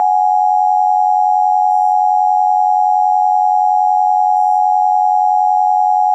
Sound effects > Electronic / Design
Emergency alert type sound
Similar to an emergency alert sound with some very slight downsampling applied, created in Vital
alert, warning, emergency